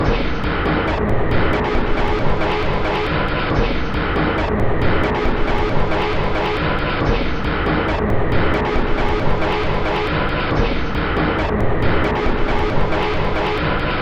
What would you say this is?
Instrument samples > Percussion
This 137bpm Drum Loop is good for composing Industrial/Electronic/Ambient songs or using as soundtrack to a sci-fi/suspense/horror indie game or short film.
Industrial, Weird, Loopable, Samples, Alien, Soundtrack, Packs, Loop, Dark, Ambient, Drum, Underground